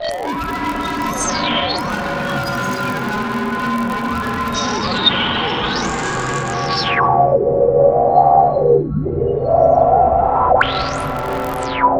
Sound effects > Electronic / Design
Roil Down The Drain 14
content-creator, horror, sci-fi, sound-design, scifi, PPG-Wave, cinematic, vst, dark-techno, science-fiction, dark-soundscapes, dark-design, noise, noise-ambient, drowning